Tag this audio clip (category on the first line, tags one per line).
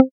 Instrument samples > Synths / Electronic
additive-synthesis
fm-synthesis
pluck